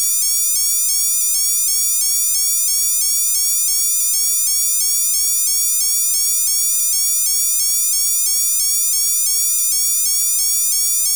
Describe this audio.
Sound effects > Electronic / Design
Looping Sci-Fi alarm SFX created using Phaseplant VST.
digital, alert, scifi, alarm, danger, warning